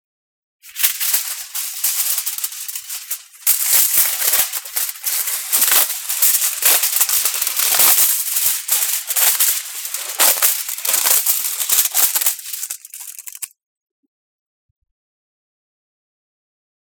Sound effects > Objects / House appliances
ripping-off-aluminium-foil
Aluminum foil being torn from its roll. Recorded with Zoom H6 and SGH-6 Shotgun mic capsule.
foil, tear, aluminium, kitchen